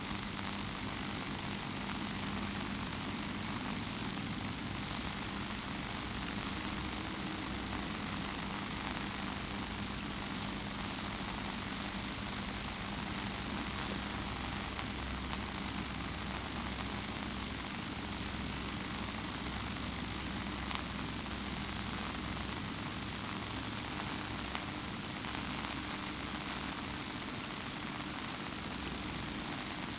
Soundscapes > Other
Ten minutes at: The Power Plant second placement
Field recording taken beneath the towers of a power plant adjacent to its dam. The foreground is the crackling sound of electricity passing through the cables, surrounded by the sounds of nature: grasshoppers, cicadas, crickets, and a few cars in the distance.
cicadas, crickets, electricity, field-recording, grasshopper, insects, lightning, nature, powerplant, summer